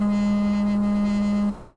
Sound effects > Objects / House appliances
COMCell-Blue Snowball Microphone Samsung Galaxy Smartphone, Vibration Nicholas Judy TDC
A Samsung Galaxy smartphone vibration.
smartphone, vibration, samsung, Blue-Snowball